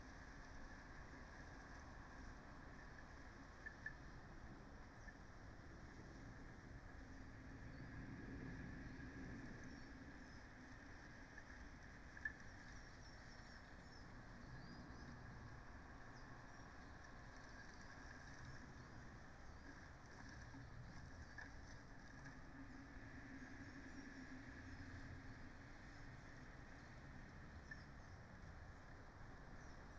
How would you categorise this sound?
Soundscapes > Nature